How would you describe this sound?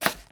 Sound effects > Other
Quick vegetable chop 6
Chef, Chief, Chop, Cook, Cooking, Home, Kitchen, Knife, Quick, Slice, Vegetable